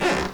Sound effects > Objects / House appliances
Creaking Floorboards 03
bare-foot, creaking, creaky, floor, floorboards, footstep, footsteps, going, grate, grind, groan, hardwood, heavy, old-building, rub, scrape, screech, squeak, squeaking, squeal, walking, weight, wooden